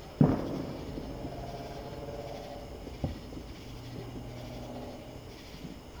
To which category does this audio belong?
Sound effects > Other